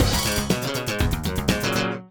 Music > Multiple instruments
achievement sound
one-shot thing i made in bandlab for 5 minutes mabye inspired by Michael Jackson's Thriller